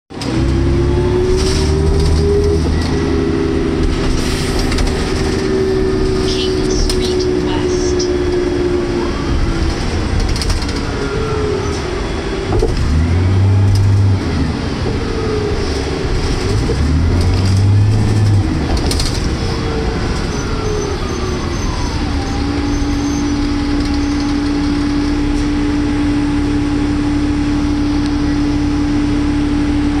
Sound effects > Vehicles
2003 New Flyer D40LF Transit Bus #1 (MiWay 0317)
I recorded the engine and transmission sounds when riding the Mississauga Transit/MiWay buses. This is a recording of a 2003 New Flyer D40LF transit bus, equipped with a Cummins ISL I6 diesel engine and Voith D864.3E 4-speed automatic transmission. This bus was retired from service in 2023.
bus, d40lf, d40lfr, driving, engine, miway, public, transit, transmission, voith